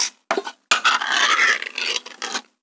Sound effects > Objects / House appliances
Open the can 2
Open a Fish Can recorded with my Shure SM 7B.
bottle, metal, can, metallic